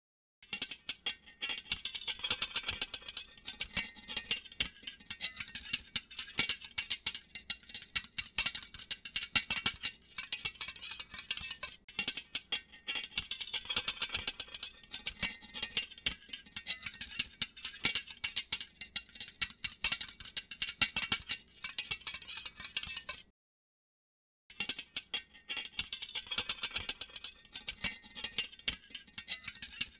Sound effects > Objects / House appliances
sword or knife binding pushing and rattling noise sound 07142025
recordings of swords or knives binding, pushing and rattling sounds I use 2 cleaver knifes and then shake them while holding the handle to create the rattle sound. can be used for characters are in a sword lock.
rattle, metal, combat, battle, TMNT, medieval, blade, samurai, ninjutsu, melee, kung-fu, pushing, martialarts, sword, binding, attack, metallic, weapon, swords